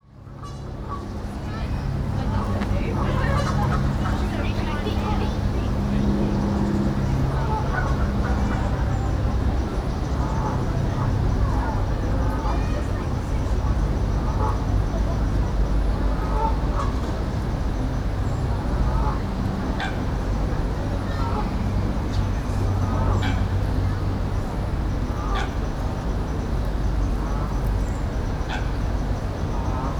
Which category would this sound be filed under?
Soundscapes > Urban